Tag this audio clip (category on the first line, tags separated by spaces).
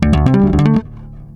Instrument samples > String
blues loop oneshots pluck plucked riffs